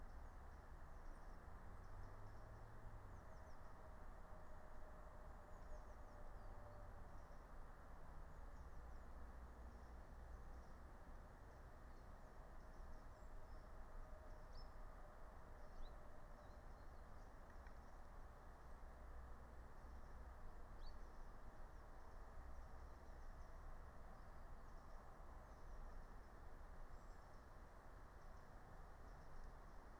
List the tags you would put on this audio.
Soundscapes > Nature
phenological-recording
alice-holt-forest
raspberry-pi
meadow
natural-soundscape
field-recording
nature
soundscape

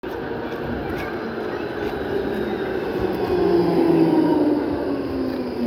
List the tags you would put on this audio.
Soundscapes > Urban
rail
tram
tramway

